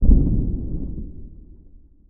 Sound effects > Electronic / Design
Previous-Moving in water/Swish-1
Previous Tag means it is not a mature sound I made. Sample used with signaturesounds 130 sound banks. Processed with phaseplant sampler, ZL EQ, Waveshaper, Camel Crusher, Khs Phase Distortion and Phaser.